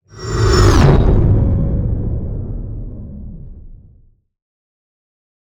Sound effects > Other
Sound Design Elements Whoosh SFX 001
ambient, audio, cinematic, design, dynamic, effect, effects, element, elements, fast, film, fx, motion, movement, production, sound, sweeping, swoosh, trailer, transition, whoosh